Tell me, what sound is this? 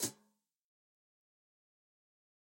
Instrument samples > Percussion
Hihat - pedal
Heavily processed, lo-fi, crunchy drum sample.
crunchy, distorted, drum, electronic, hit, lo-fi, lofi, perc, percussion